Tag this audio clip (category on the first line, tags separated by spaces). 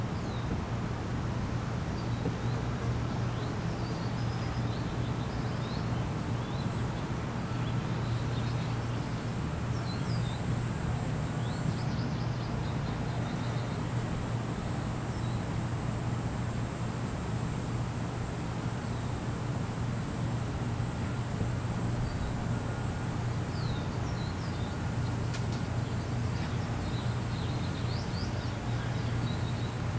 Sound effects > Animals
cricket outside traffic